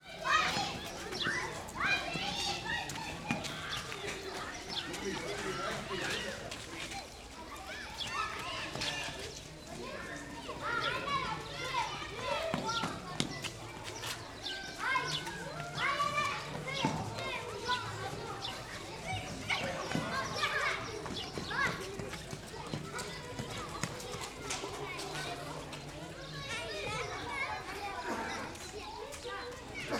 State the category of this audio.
Soundscapes > Other